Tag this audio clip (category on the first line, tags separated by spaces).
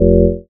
Instrument samples > Synths / Electronic
fm-synthesis bass